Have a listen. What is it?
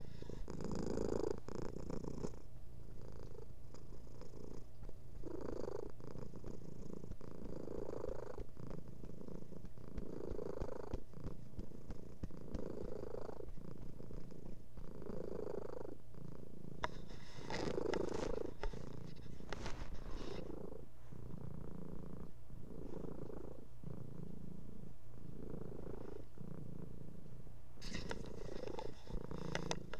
Music > Other
cat purr (soothing (2)
my cat purring .
purr, cat, cat-sounds